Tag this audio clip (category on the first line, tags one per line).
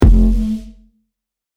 Instrument samples > Synths / Electronic
Bass
Electronic
Oneshot